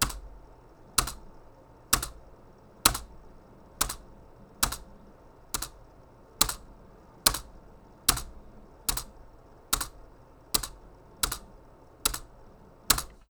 Sound effects > Objects / House appliances
CMPTKey-Blue Snowball Microphone, CU Space Bar Nicholas Judy TDC
Pressing a space bar on a keyboard.
keyboard, space-bar, Blue-brand, Blue-Snowball